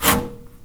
Sound effects > Other mechanisms, engines, machines
Handsaw Oneshot Hit Stab Metal Foley 12
foley, fx, handsaw, hit, household, metal, metallic, perc, percussion, plank, saw, sfx, shop, smack, tool, twang, twangy, vibe, vibration